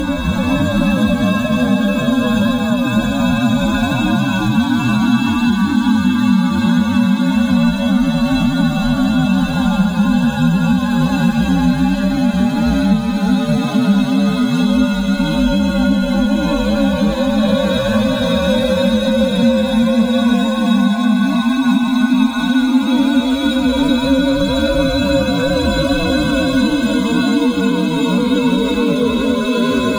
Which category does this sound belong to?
Sound effects > Experimental